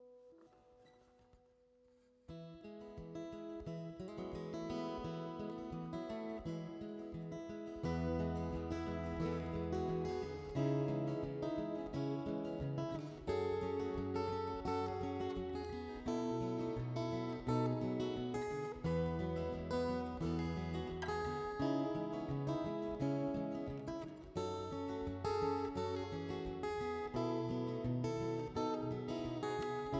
Music > Multiple instruments
emotive acoustic guitar chord sequence perfect for an outro or intro of a film. Gear Used: Abelton Takimine En10c